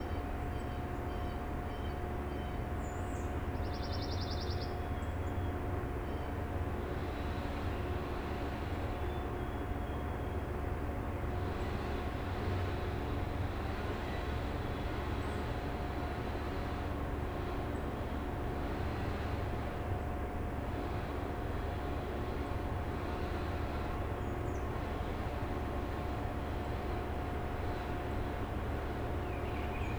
Soundscapes > Urban
A Spring Day With Machinery and Birds In A Residential Neighborhood-003
This is the last part of a 3 part field recording made one spring day of a residential neighborhood featuring road work and lots of chirping birds. This last part is not very busy.